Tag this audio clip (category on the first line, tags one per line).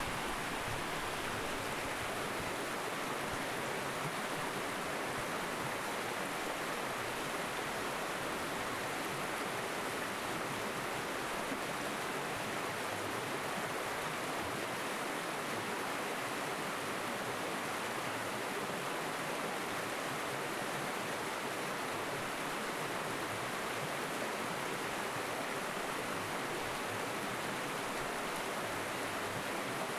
Soundscapes > Nature
bank
bridge
cars
flowing
river
rocky
small
under
water